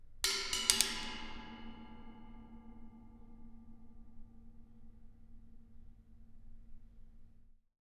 Sound effects > Objects / House appliances
Hitting metal staircase 2
Hitting the metal part of the staircase in my apartment building.
Metal, Stairs, Echo, Staircase, Metallic